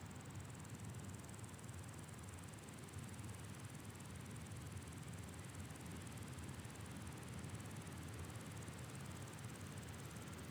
Soundscapes > Nature
Grasshoppers and crickets chirp in a field
Recorded with TASCAM DR100mk2 +rode stereo mic . Night time field grasshoppers crickets